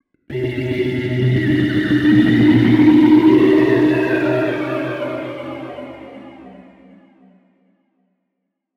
Sound effects > Electronic / Design
Optical Theremin 6 Osc ball infiltrated-010
Digital, Sci-fi, Infiltrator, Bass, DIY, Instrument, Sweep, Theremins, Experimental, Trippy, SFX, Spacey, noisey, Robotic, Otherworldly, Robot, Theremin, Electronic, Glitchy, Electro, Alien, Scifi, FX, Dub, Handmadeelectronic, Analog, Synth, Noise, Optical, Glitch